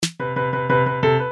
Music > Multiple instruments
Piano + Percussion
instruments, music, percussion, piano